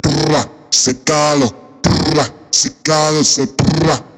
Music > Other
Random Brazil Funk Acapella 1
Recorded with my Headphone's Microphone. I was speaking randomly, I even don't know that wha did I say, and I just did some pitching and slicing works with my voice. Processed with ZL EQ, ERA 6 De-Esser Pro, Waveshaper, Fruity Limiter. The first version of this sound in this web was deleted, because it was sounded shitty.
Acapella, Brazil, BrazilFunk, Vocal